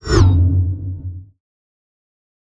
Sound effects > Other
Sound Design Elements Whoosh SFX 014
ambient, audio, cinematic, design, dynamic, effect, effects, element, elements, fast, film, fx, motion, movement, production, sound, sweeping, swoosh, trailer, transition, whoosh